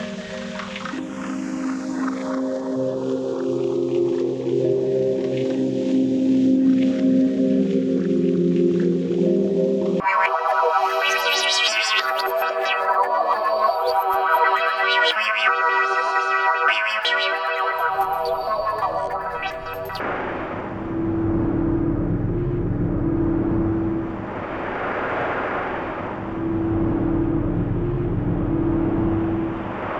Instrument samples > Synths / Electronic
Ambient sounds for Morphagene. Pads and space.